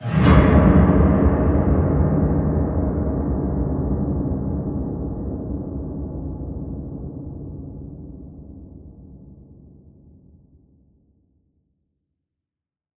Sound effects > Other mechanisms, engines, machines
Horror Sting (Slide Down Bass Strings)
I love seeing how people use my work! While studying piano tuning and repair, I decided to do a bunch of recordings of what the strings sound like without an action. (Fun fact, piano strings can resonate for minutes without dampers!) Apologies for the clicking noise about 1.5 seconds in, I don't know how to remove it.
spooky, spooky-piano, stab, piano-strings, sting, horror-hit, horror, horror-impact, dramatic-sting, spoopy, hit, horror-sting, cinematic-hit, horror-stab, piano-strings-slide